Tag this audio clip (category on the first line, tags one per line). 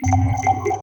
Sound effects > Electronic / Design
alert
confirmation
digital
interface
message
selection